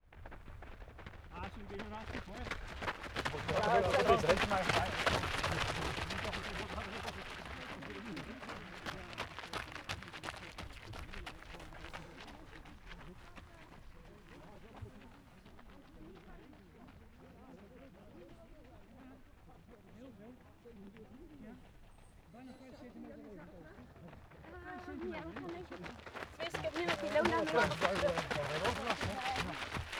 Sound effects > Human sounds and actions
Group Running. Steps. People
Group runnibng on park Recorded that sound by myself with Recorder - H1 Essential